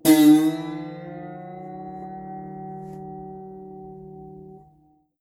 Sound effects > Other
TOONBoing-Samsung Galaxy Smartphone, CU Guitar, Boing, Up Nicholas Judy TDC
A guitar boing up.
boing, up, cartoon, Phone-recording, guitar